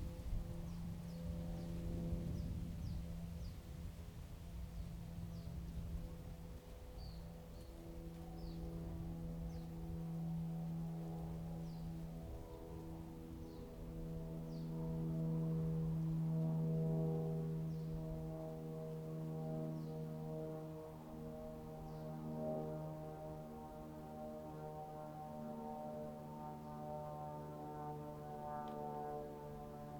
Soundscapes > Urban
2025 09 09 13h45 Quemigny D35 T junction - Zoom H2n MS150 facing SW
Subject : Ambience recording in Quemigny 21220 from the bench under the big tree on the T junction with a Zoom H2n in MS150° mode facing SW (away from the church.) Date YMD : 2025 September 09 at 13h45 Location : Quemigny 21220 Bourgogne-Franche-Comte Côte-d'Or France GPS = 47,229516 4,864703 Hardware : Zoom H2n on a magic arm, with folded socks on used as a windcover. Weather : Mostly cloudy with pockets of light, a bit of wind. Processing : Trimmed and normalised in Audacity. Notes : The bells ring on the hour, and half hour.
MS, Zoom, September, MS150, road, Quemigny, cars, village, FR-AV2, Quemigny-Poisot, rural, 2025, H2n, Bourgogne, church, 21220, Tascam, bells, France